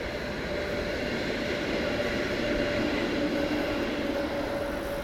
Sound effects > Vehicles
Tram 2025-10-27 klo 20.13.02
Sound recording of a tram passing by. Recording done in Hervanta, Finland near the tram line. Sound recorded with OnePlus 13 phone. Sound was recorded to be used as data for a binary sound classifier (classifying between a tram and a car).